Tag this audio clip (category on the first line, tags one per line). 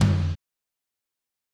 Instrument samples > Percussion
drum
drums
hit
one
percussion
shot
tom